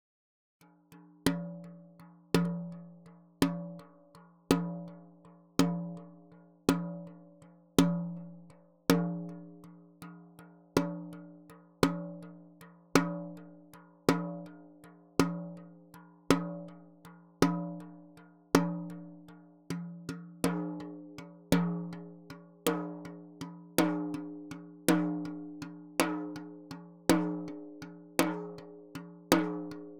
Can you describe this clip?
Music > Solo percussion
hi tom-light and snappy hits10 inch by 8 inch Sonor Force 3007 Maple Rack
acoustic, beat, beatloop, beats, drum, drumkit, drums, fill, flam, hi-tom, hitom, instrument, kit, oneshot, perc, percs, percussion, rim, rimshot, roll, studio, tom, tomdrum, toms, velocity